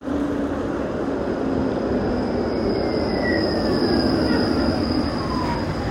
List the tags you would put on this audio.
Sound effects > Vehicles
city Tram urban

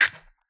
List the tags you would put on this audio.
Sound effects > Objects / House appliances
matchstick-box matchstick

